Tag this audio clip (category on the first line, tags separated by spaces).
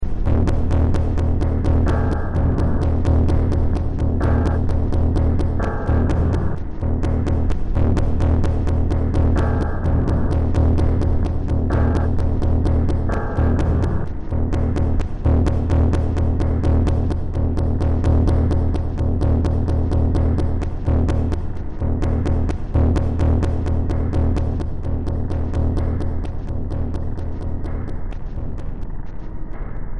Music > Multiple instruments
Cyberpunk Noise Sci-fi Horror Ambient Industrial Soundtrack Underground Games